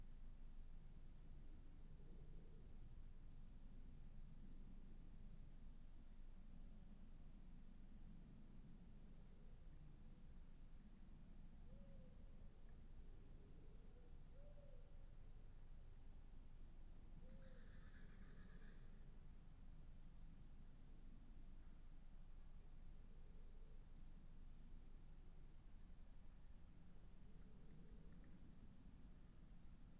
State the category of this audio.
Soundscapes > Nature